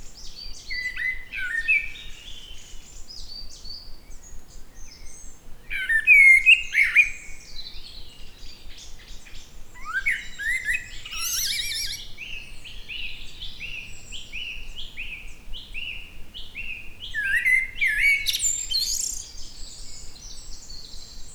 Sound effects > Animals
Subject : A bird in the "Combe du moulin" Date YMD : 2025 04 18 14h10 Location : Gergueil France. Hardware : Zoom H5 stock XY capsule. Weather : Processing : Trimmed and Normalized in Audacity.